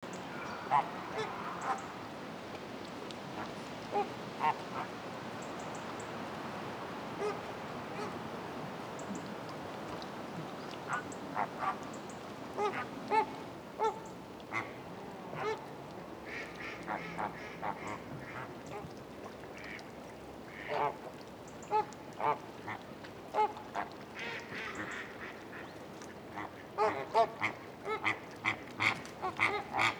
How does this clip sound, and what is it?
Soundscapes > Nature
pink flamingo at Branféré
bird, birdsong, field-recording, nature